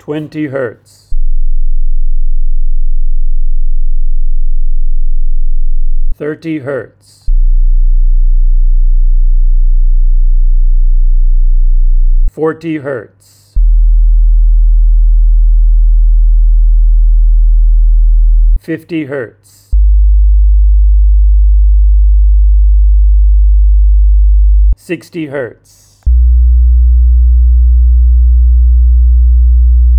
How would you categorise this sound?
Sound effects > Experimental